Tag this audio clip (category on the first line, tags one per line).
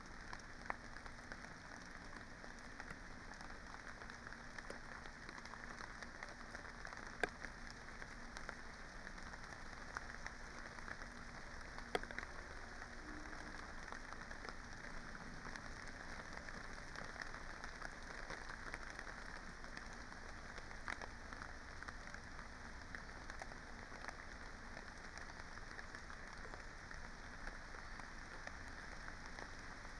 Soundscapes > Nature
weather-data
phenological-recording
modified-soundscape
soundscape
data-to-sound
sound-installation
alice-holt-forest
artistic-intervention
Dendrophone
nature
raspberry-pi
field-recording
natural-soundscape